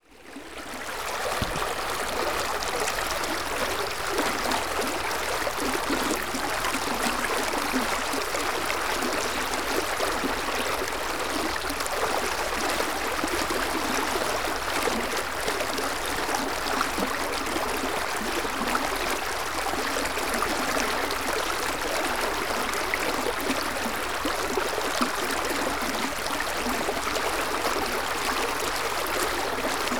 Soundscapes > Nature
A recording of water in a stream passing through a flume.
Re, flume, water, ambience, field, flowing, recording